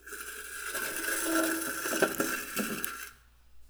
Sound effects > Objects / House appliances
aluminum can foley-001
alumminum, can, foley, fx, household, metal, scrape, sfx, tap, water